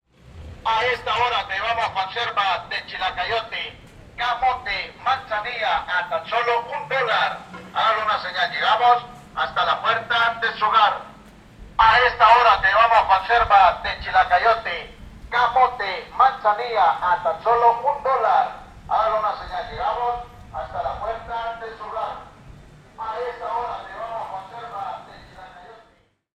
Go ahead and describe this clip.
Speech > Solo speech
Vendedor de conservas El Salvador
Vocal sound of a street vendor with a megaphone selling chilacayote and camote preserves in Sonsonate, El Salvador.
america, salvador, street, recording, central, voice, vendor, el, field